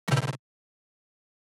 Sound effects > Electronic / Design
Glitch (Faulty Core) 2
ui-glitch, ui-glitch-sound, audio-glitch-sound-effect, computer-glitch-sound, computer-error-sound, ui-glitch-sound-effect, machine-glitch, audio-glitch, audio-glitch-sound, glitch-sound, machine-glitching, machine-glitch-sound, error-fx, computer-glitch-sound-effect, computer-glitch, computer-error, glitch-sound-effect, error-sound-effect